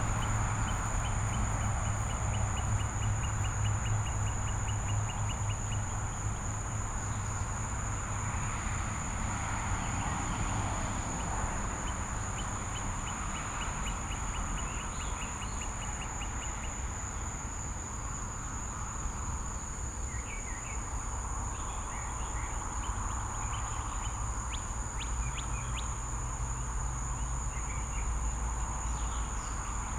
Soundscapes > Urban
AMBSubn-Summer Dawn in the Trailer Park, birds, crickets, nearby highway traffic, 530AM QCF Fairhope Alabama Zoom F3 with LCT 440 Pure
Dawn in the trailer park, Fairhope, Alabama. Birdsong, crickets, passing traffic on Highway 98. Summer morning. 5:30AM
crickets, dawn, birds, morning, birdsong, summer, field-recording, traffic, highway